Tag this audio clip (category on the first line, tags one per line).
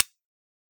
Sound effects > Other mechanisms, engines, machines
click
foley
percusive
recording
sampling